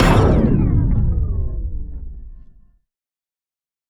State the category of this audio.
Sound effects > Other